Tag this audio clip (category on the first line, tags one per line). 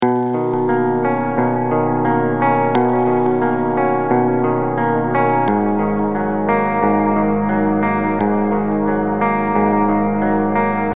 Music > Solo instrument
Guitar
Plucked